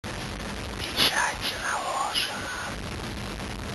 Speech > Solo speech
mystical sound, Stamp applied